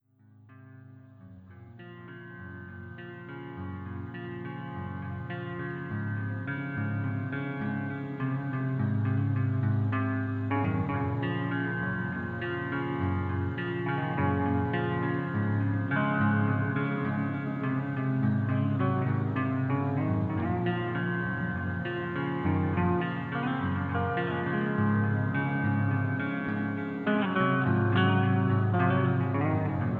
Music > Multiple instruments
Recorded this while going through a break up so maybe it can be of use to you in your creative endeavors, as always I'd love to see what stuff my work is being used in love yalls projects The VST I used was amplitube, BPM I am uncertain of and as for my DAW I work in reaper and do some editing in audacity. I did downtune the guitar a bit in post by changing the pitch in Amplitube and it was recorded with my telecaster, looper pedal and digitech drop going into a focusrite